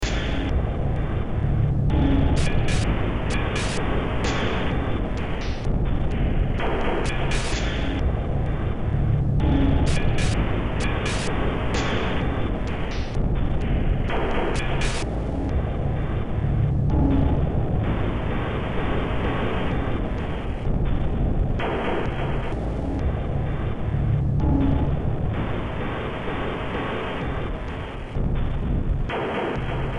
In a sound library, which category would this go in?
Music > Multiple instruments